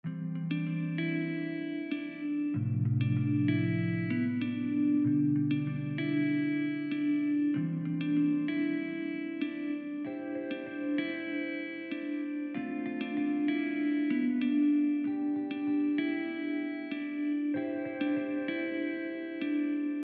Music > Solo instrument
a simple guitar loop I made in FL Studio for a song I was working on